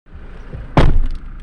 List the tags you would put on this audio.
Sound effects > Other
car
close

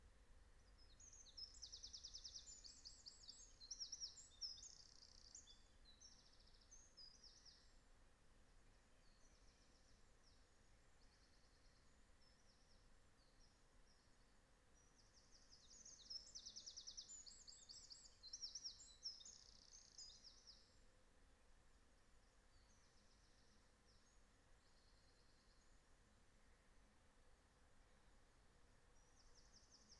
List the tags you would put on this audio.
Soundscapes > Nature
meadow
field-recording
nature
phenological-recording
raspberry-pi
natural-soundscape
soundscape
alice-holt-forest

